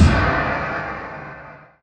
Instrument samples > Percussion
gongcrash 1 long
Many crashes, rides and cymbals mixed at lowered pitch. The attack is doubled but with small deletions and puny waveform additions to create a singe attack and not a roll. The fade out's stepped (stairway-like) envelope was drawn on WaveLab 11.
atmospheric, heavy, antimonarchy, thrash, gongcrash, bass-cymbal, stepped, brass, crash, steel, stairway-like-fade-out, death-metal, metal, gong, cymbals, rock, percussion, bass, bronze, staircase-like-fade-out, crashgong, cymbal, metallic